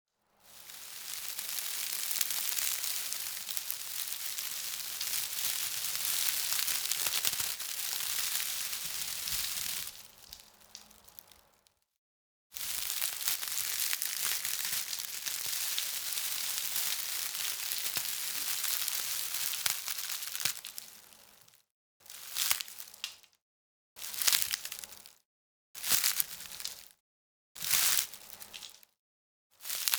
Sound effects > Objects / House appliances
Wood Shavings Crackling and Handling
Handling, twisting, scrunching, squashing, tearing and slapping a handful of wooden shavings. Recorded on Rode NT1-A.
bush, chips, crack, crunch, noise, rustle, scrub, scrunch, shavings, sizzle, tear